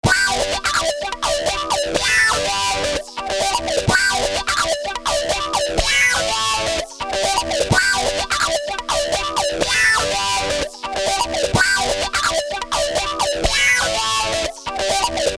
Music > Solo instrument
Ableton Live. VST......Fury-800......Guitar 125 bpm Free Music Slap House Dance EDM Loop Electro Clap Drums Kick Drum Snare Bass Dance Club Psytrance Drumroll Trance Sample .